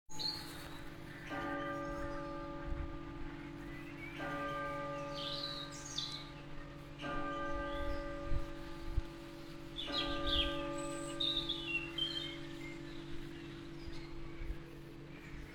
Soundscapes > Nature
Birds and Church Bells
Bird song and church bells recorded on 9th May 2025 at St. Peter's church in Bournemouth, UK. Recorded with a Google Pixel 6a phone. I want to share them with you here.